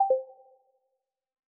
Sound effects > Electronic / Design
Made with the Vital synth in FL Studio — [SFX: Resume]. Designed for casual games.
casual, pad, videogames